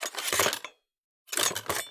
Sound effects > Objects / House appliances
A recording of a kitchen bread knife being pushed in and pulled out of a metal block.
kitchen, slow, sheathe, knife, pushing-in, friction, unsheathe, metal, pulling-out